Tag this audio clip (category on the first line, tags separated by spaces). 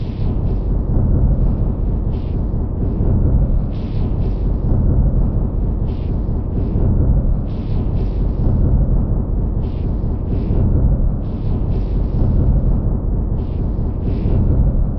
Soundscapes > Synthetic / Artificial
Alien Ambient Dark Drum Industrial Loop Loopable Packs Samples Soundtrack Underground Weird